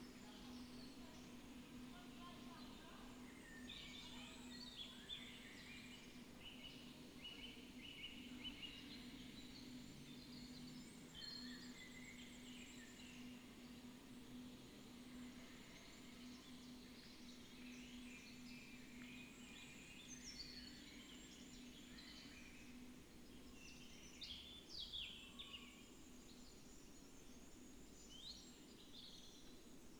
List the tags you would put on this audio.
Soundscapes > Nature
Dendrophone phenological-recording

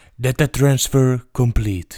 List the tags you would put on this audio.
Speech > Solo speech
calm data male man videogame

